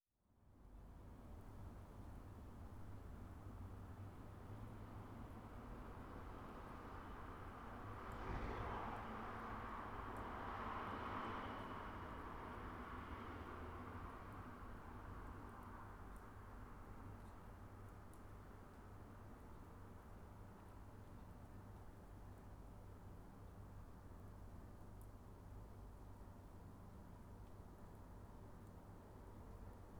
Soundscapes > Urban
Late night ambience in Parkheid, Glasgow.